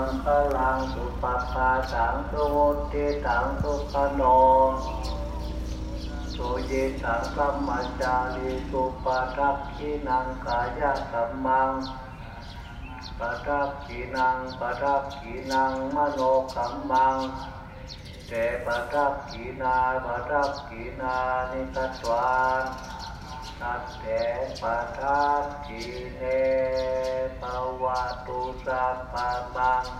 Soundscapes > Urban
Recording of a Buddhist monk speaking in Chiang Khong, Thailand. Calm voice, spiritual tone, with occasional background temple sounds.